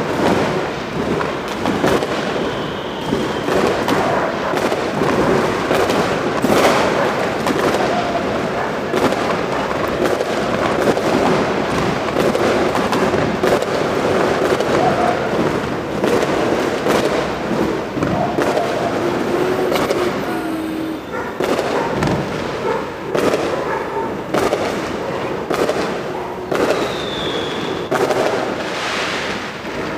Sound effects > Natural elements and explosions
Fireworks in Lima 01-01-2026 (excerpt)
I've recorded it in January 01, 2016 at 12:02 AM, at Santiago de Surco neighborhood in Metropolitan Lima, near Chorrillos, in an apartment backyard. It sounds like a battlefield from New Year's Eve to past midnight (And so in "Holy" Christmas Eve night. I uploaded an excerpt because some people ruined the original 11-minutes record. You can use it for a war battle scene.
Battle, Combat, Explosion, Rumble, War